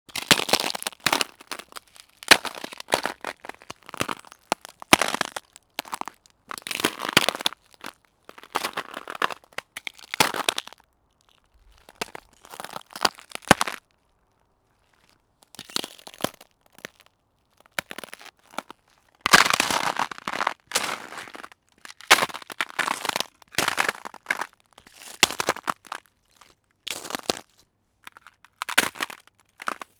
Soundscapes > Nature
Steps on ice. ice cracking. ice breaking.
crack, cracking, ice